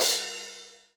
Instrument samples > Percussion
crash XWR 1
Paiste, bang, Zultan, Soultone, crunch, clang, Zildjian, sinocymbal, clash, spock, shimmer, Meinl, crack, multi-China, cymbal, sinocrash, crash, metal, low-pitched, Stagg, Sabian, metallic, Istanbul, polycrash, smash, multicrash, Avedis, China